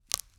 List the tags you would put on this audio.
Sound effects > Experimental
punch
onion
thud
bones
foley
vegetable

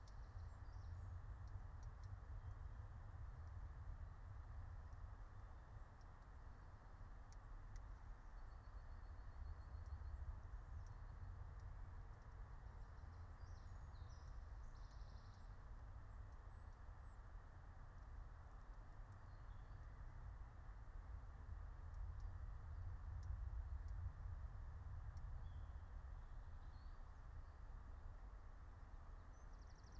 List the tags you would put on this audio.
Soundscapes > Nature
alice-holt-forest
meadow
nature
raspberry-pi
soundscape

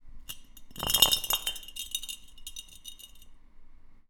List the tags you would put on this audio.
Sound effects > Objects / House appliances
Wine
Bottle

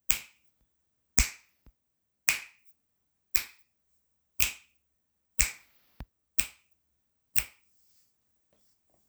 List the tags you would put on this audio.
Instrument samples > Percussion
drums
finger
fingers
fingersnap
hand
hit
percussion
samples
snap
snapping

